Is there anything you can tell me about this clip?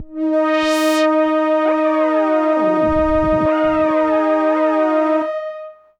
Sound effects > Experimental
Analog Bass, Sweeps, and FX-098
from a collection of analog synth samples recorded in Reaper using multiple vintage Analog synths alongside analog delay, further processing via Reaper
basses, effect, robotic, weird, robot, analogue, analog, machine, synth, electro, electronic, retro, fx, bass, oneshot, mechanical, sample, dark, korg, sci-fi, snythesizer, alien, vintage, complex, scifi, pad, sfx, sweep, trippy, bassy